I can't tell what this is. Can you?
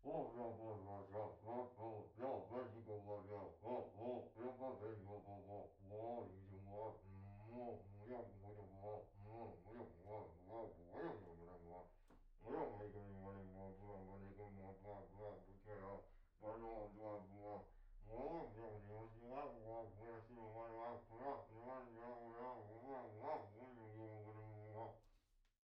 Speech > Other
Mumbo Jumbo 3
indoor, Mumble, mumbling, mumbo, NT5, Rode, solo-crowd, unintelligible, XY